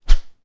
Sound effects > Objects / House appliances
Whoosh - Plastic Hanger 2 (middle clip) 7
Subject : Whoosh from a plastic clothe hanger. With clips adjustable across the width of it. I recorded whooshes with the clip on the outer edge and near the center hanger. Middle clip here refers to being closest to the middle of the hanger. Date YMD : 2025 04 21 Location : Gergueil France. Hardware : Tascam FR-AV2, Rode NT5 pointing up and towards me. Weather : Processing : Trimmed and Normalized in Audacity. Probably some fade in/out.
SFX, Rode, swing, FR-AV2, Plastic, Transition, Hanger, Whoosh, Tascam, NT5, Fast, coat-hanger, swinging